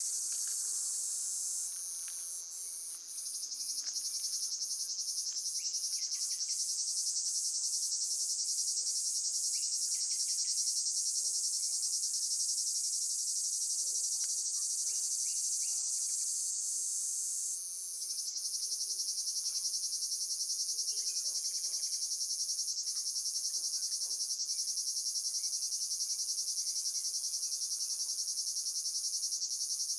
Soundscapes > Nature
Cicadas - Cigales - South of France 2
Cicadas recorded in South of France, near Montpellier